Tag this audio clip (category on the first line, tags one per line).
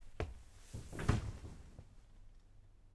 Sound effects > Objects / House appliances
movement
home
falling
human
sofa
furniture